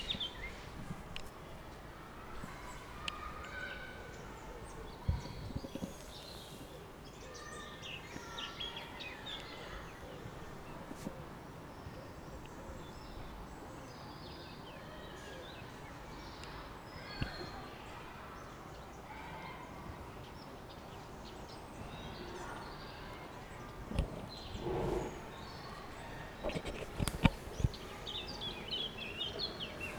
Soundscapes > Nature
20251203 baloo pardal car
baloo, car, pardal